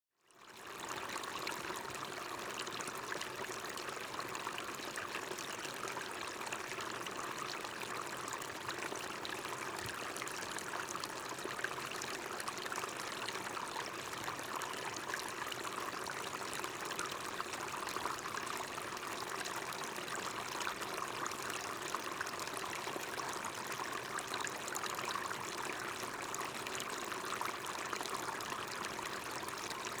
Soundscapes > Nature
Snow melt streamlet entering beaver pond, Beaver Flats Recreation Area, west of Bragg Creek Alberta. April 4, 2025. 1150 MDT.
Recorded April 4, 2025 1150 MDT at Beaver Flats Ponds west of Bragg Creek Alberta. Small snow melt trickle entering beaver pond. 15° C, sunny, low winds. Recorded with Rode NTG5 supercardoid shotgun microphone in Movo blimp on pole, deadcat wind protection. Mono. Low cut 100 Hz, normalization, content cuts, and fades in/out in Izotope RX10. Thankyou!
gurgle, stream, brook, babbling, trickle